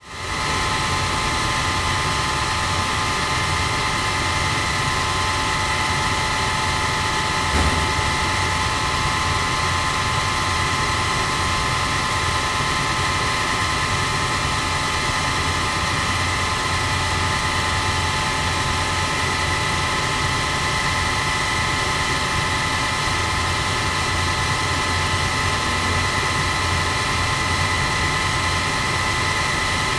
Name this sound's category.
Sound effects > Other mechanisms, engines, machines